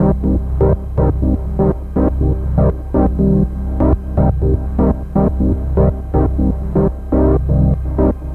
Music > Solo instrument
115 D# Poly800Filter Loop 01
Synth Melody made using Korg Poly-800 analog synth
Retro, Music, Vintage, Rare, Analog, Loop, Analogue, MusicLoop, SynthLoop, Synth, 80s, Texture, SynthPad